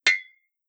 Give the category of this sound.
Sound effects > Other